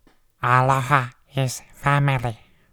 Speech > Solo speech
aloha is family
cute, voice, alien, english, calm